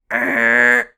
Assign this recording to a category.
Sound effects > Natural elements and explosions